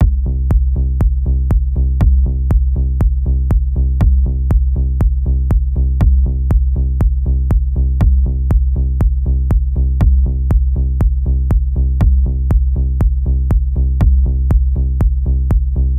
Music > Multiple instruments
Disco Floor Base Drum Loop with Bass 120bpm
Basic disco drum loop with bass Samples are from the default Digitakt 2 library.
120-bpm, 120bpm, beat, disco, drum, drum-loop, drums, groovy, loop, percussion, percussion-loop, rhythm, Thermionic